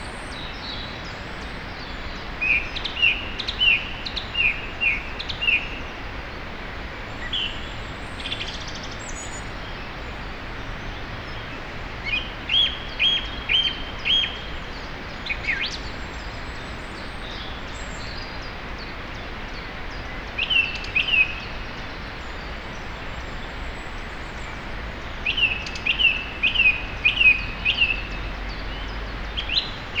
Soundscapes > Nature
Dawn chorus recorded in late March in the Romanche Valley, near Le Bourg-d'Oisans in the Isère Alps. The main featured bird is a song thrush. Also heard are the nearby Romanche and Vénéon rivers. Recorded using a pair of Sennheiser MKH8040s in ORTF arrangement.